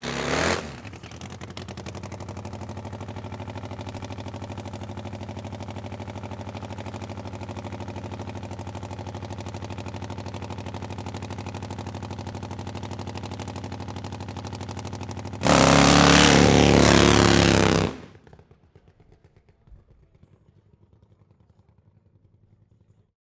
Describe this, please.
Soundscapes > Other
Wildcards Drag Race 2025